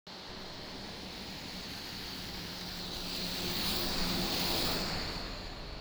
Sound effects > Vehicles
tampere bus1
bus passing by near Tampere city center